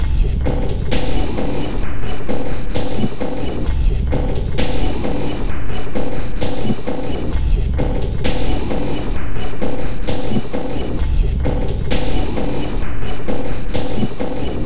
Instrument samples > Percussion
This 131bpm Drum Loop is good for composing Industrial/Electronic/Ambient songs or using as soundtrack to a sci-fi/suspense/horror indie game or short film.
Industrial, Underground, Packs, Alien, Soundtrack, Drum, Samples, Loop, Weird, Dark, Loopable, Ambient